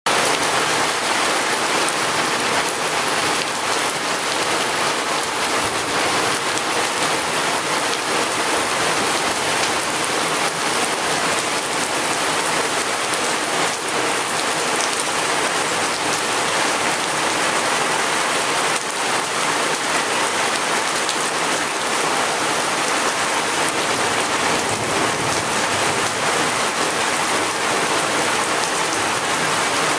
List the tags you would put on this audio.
Sound effects > Experimental
drops
heavy
rain
weather
raining

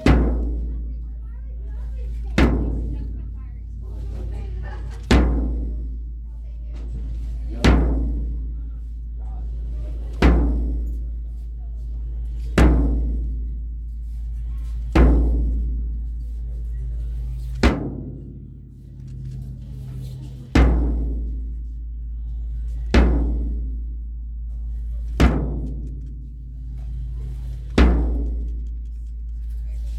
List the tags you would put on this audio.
Music > Solo percussion
bang
Phone-recording
hit
drum
bass

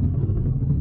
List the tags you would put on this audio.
Sound effects > Objects / House appliances
Boulder
Roll
Marble
Ball

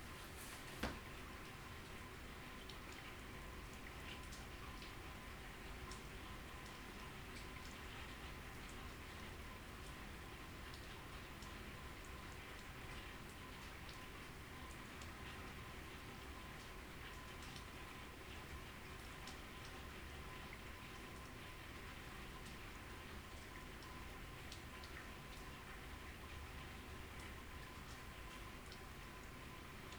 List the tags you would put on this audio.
Sound effects > Natural elements and explosions
drippinggutters
Rain
Inside

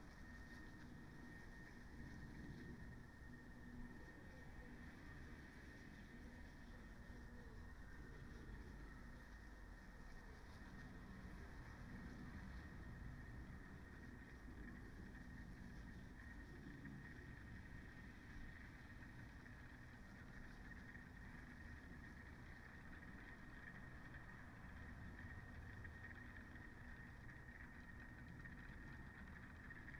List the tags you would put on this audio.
Soundscapes > Nature
weather-data
data-to-sound
alice-holt-forest
field-recording
artistic-intervention
natural-soundscape
Dendrophone
raspberry-pi
soundscape
modified-soundscape
phenological-recording
nature
sound-installation

